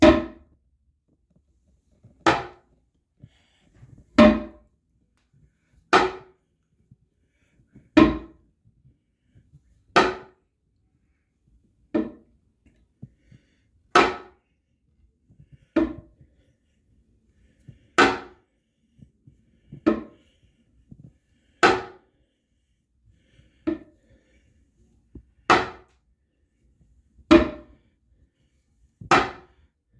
Sound effects > Objects / House appliances
FOLYProp-Samsung Galaxy Smartphone, CU Toilet Seat, Lid, Lift, Open, Close Nicholas Judy TDC
A toilet seat lid lifting open and closed.
open, toilet, Phone-recording, foley, lift, seat, close, lid